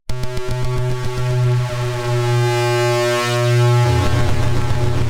Sound effects > Electronic / Design
Optical Theremin 6 Osc ball delay-004
Glitchy, Robot, Robotic, Theremin